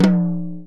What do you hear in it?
Music > Solo percussion
Hi-Tom recording made with a Sonor Force 3007 10 x 8 inch Hi-Tom in the campus recording studio of Calpoly Humboldt. Recorded with a Beta58 as well as SM57 in Logic and mixed and lightly processed in Reaper